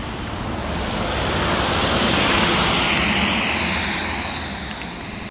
Sound effects > Vehicles
Car accelerating on Hervannan valtaväylä
Car driving sound on a slightly wet road. Recorded outdoors on Hervannan valtaväylä road in Hervanta, Tampere using an iPhone 14 Pro for a university vehicle classification project.